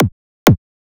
Instrument samples > Percussion
Synthed with 3xOSC only. Processed with Waveshaper, ZL EQ, ERA 6 De-Esser. Then tweaked ''Pogo'' amount in FLstudio sampler to make it punchy. Actually it retouched from a kick that called ''Analog Kick 2'' in my sample pack. P.S I don't know how to do channel mastering work so I put different version here, they may sounds same but acually they have a bit difference.